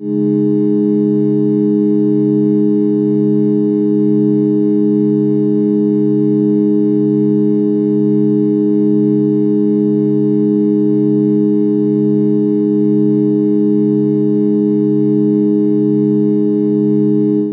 Instrument samples > Synths / Electronic
Sunday Relaxing Ambient Pad
Ambient pad done this Sunday while cooking Produced with the amazing grmtool atelier
pad, synth, space, Ambient, space-pad